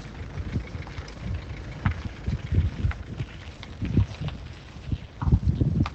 Sound effects > Vehicles
car idle engineoff windy
Car equipped with studded tyres, coming to a full stop from idle speed with the engine turning off, on an asphalt road. Recorded in an urban setting with windy conditions, in a near-zero temperature, using the default device microphone of a Samsung Galaxy S20+.
engineoff, car, idle